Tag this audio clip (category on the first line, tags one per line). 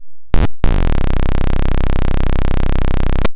Sound effects > Electronic / Design
Instrument
Electronic
Optical
DIY
Dub
Alien
Scifi
Sweep
Theremin
Glitchy
Infiltrator